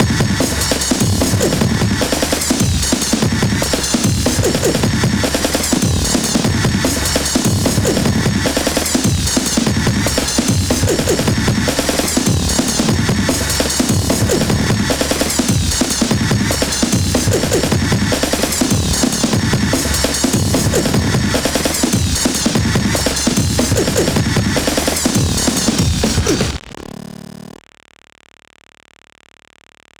Music > Multiple instruments
tentieth break
breaks breaks breaks 149 bpm